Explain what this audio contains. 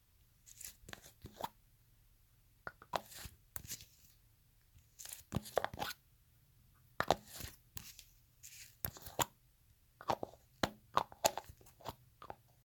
Sound effects > Objects / House appliances
I recorded myself opening and closing a facial-cream container - Quality: bit of reverb and white-noise.